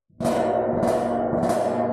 Sound effects > Objects / House appliances
Hits by fingers on a household object. Recorded with phone mobile device NEXG N25
Desk, Fingers, Glass, Hits